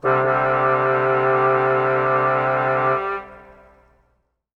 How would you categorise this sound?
Music > Multiple instruments